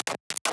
Sound effects > Experimental
lil glitchy fx 1
laser, glitch